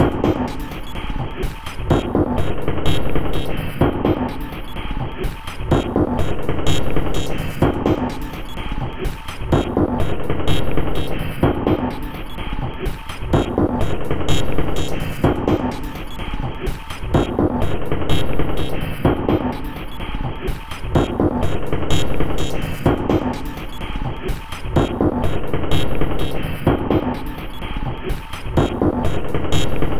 Instrument samples > Percussion
This 63bpm Drum Loop is good for composing Industrial/Electronic/Ambient songs or using as soundtrack to a sci-fi/suspense/horror indie game or short film.
Alien, Ambient, Dark, Drum, Industrial, Loop, Loopable, Packs, Samples, Soundtrack, Underground, Weird